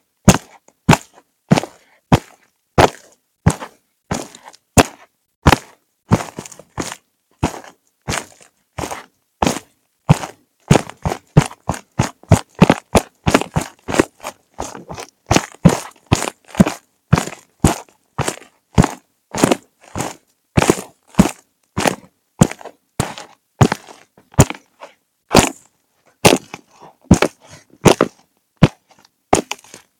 Sound effects > Other
Incredibly crunchy sounding earthy footsteps, completely for use both personal and commercial! (altho i have my doubts that any credible company would use a sound from me lol) Fun fact!
gravel, walking, earth, brush, trail, steps, crunchy, grass, walk, fake, crunch, foley, dirt, step, footsteps, boots